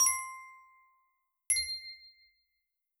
Sound effects > Other
A basic magic ding sound w/ an octave up option. Good for games, cartoons, etc.
bell, magic